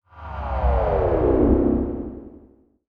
Sound effects > Electronic / Design
I LOVE this one! Made for a cartoon short film (before I passed it off to the next operator). In this sequence, a bunch of cartoon Foxes fell off the edge of a cliff. I tossed this in, turned down low, just for added ambience! Architecturally, the sound comprises of a digital sound, the pitch slowly dropping off with time. Reverb and delay added for ambient purposes. Makes for a great game-over SFX UI element or a background dropping sound.